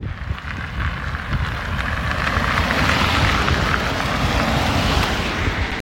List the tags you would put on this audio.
Soundscapes > Urban
Road Transport Cars

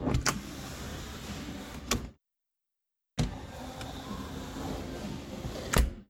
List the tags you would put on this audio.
Sound effects > Objects / House appliances
foley slide window

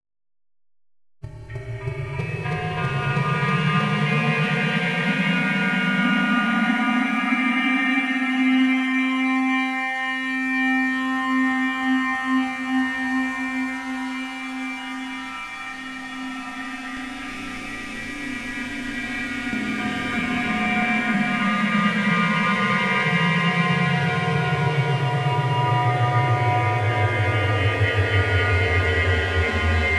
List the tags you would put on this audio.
Soundscapes > Synthetic / Artificial
wind shimmer sfx dark drone synthetic bass rumble long experimental bassy effect glitchy roar alien landscape low fx shifting ambience howl ambient slow texture glitch evolving shimmering atmosphere

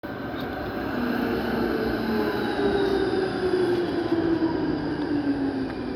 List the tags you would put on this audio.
Soundscapes > Urban
rail tramway

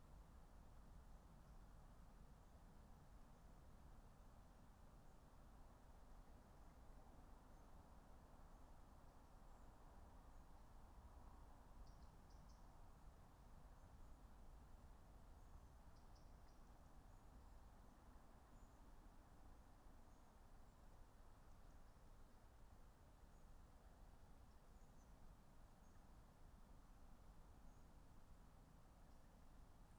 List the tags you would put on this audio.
Soundscapes > Nature
alice-holt-forest artistic-intervention data-to-sound Dendrophone field-recording modified-soundscape natural-soundscape nature phenological-recording raspberry-pi sound-installation soundscape weather-data

